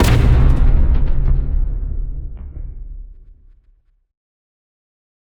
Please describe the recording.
Sound effects > Other
Sound Design Elements Impact SFX PS 048
effects, thudbang, strike, sharp, smash, rumble, crash, design, shockwave, hard, transient, hit, power, cinematic, game, sound, explosion, heavy, percussive, sfx